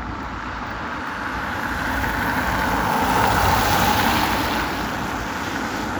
Soundscapes > Urban
A car driving by in Hervanta, Tampere. Some wind may be heard in the background. The sound was recorded using a Samsung Galaxy A25 phone
Car, field-recording